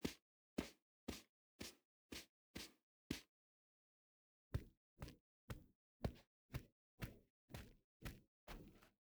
Sound effects > Other
Rag Doll Footsteps
Variations of foley footsteps over two different semi-generic surfaces.
bear, doll, fabric, footsteps, quiet, rag, soft, steps, subtle, teddy, walk, wool